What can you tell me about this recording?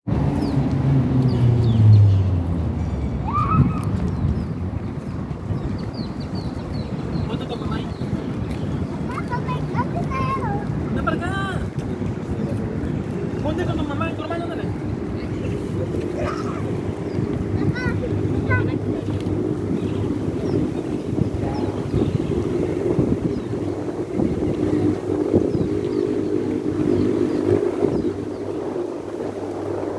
Soundscapes > Nature
Soundwalk into Parque Tomás Garrido (Villahermosa, Tabasco) / Caminata Sonora dentro del Parque Tomás Garrido (Villahermosa, Tabasco)
It captures the nature soundscape in Parque Tomás Garrido Villahermosa, Tabasco (Mexico). The recording features nature ambience in a park: zanates, birds, steps, voices from people, people walking and running. Captura el paisaje sonoro natural del Parque Tomás Garrido en Villahermosa, Tabasco. Se escuchan sonidos de zanates, pajaros, voces, pasos, gente caminando, aves y gente caminando y corriendo. Audio por Joaquín Avendaño
Mexico, soundwalk, environment, urbanenvironment, binaural, sounds, ambient, nature, latinamerica, archivosonoro, villahermosa, street, city, urbanexploration, Tabasco, park, students, birds, recording, soundscape, field-recording, urban